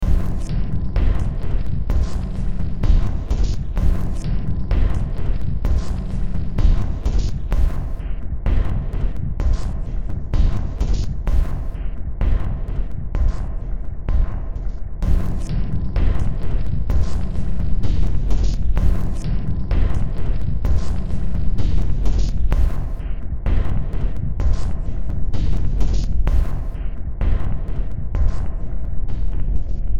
Music > Multiple instruments
Demo Track #4048 (Industraumatic)
Ambient, Horror, Soundtrack